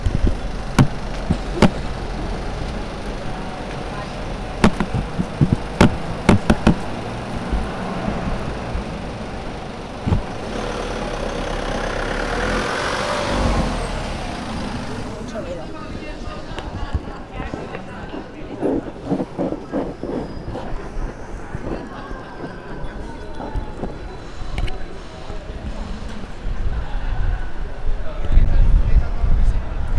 Sound effects > Human sounds and actions
20250326 PlOrfila Humans Traffic Commerce Chaotic Energetic
Chaotic, Commerce, Energetic, Humans, Traffic